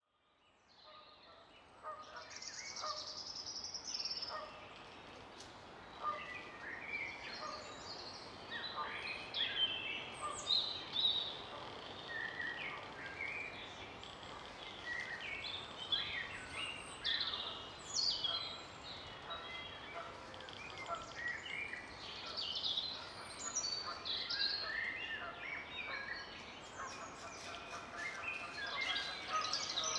Soundscapes > Nature
Chestnut Wood Dawn Chorus
Dawn chorus recorded on 25/04/2025. Time represented is from about 05:10 to 06:40, with sunrise being 05:51 on this day (all times BST). The recording has been edited to remove the worst of the aircraft and road noise. It is, therefore, shorter than the times above would suggest. Creaking of trees as they move in the breeze can be heard as well as many birds. The footsteps that can be heard, particularly at the end are not me as I wasn't there! These may be muntjac, which do occur in the area. The location is an area of woodland in a nature reserve operated by Essex Wildlife Trust that is adjacent to Hanningfield reservoir, Essex, UK.
bird, birds, birdsong, dawn, dawn-chorus, field-recording, morning, nature, reservoir, spring, woodland